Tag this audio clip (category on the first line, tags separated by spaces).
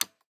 Sound effects > Human sounds and actions
activation click interface